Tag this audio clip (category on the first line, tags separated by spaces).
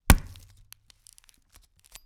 Sound effects > Experimental
bones foley onion punch thud vegetable